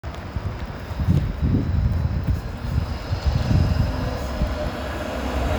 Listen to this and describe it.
Soundscapes > Urban
A tram passing the recorder in a roundabout. The sound of the tram accelerating can be heard. Recorded on a Samsung Galaxy A54 5G. The recording was made during a windy and rainy afternoon in Tampere.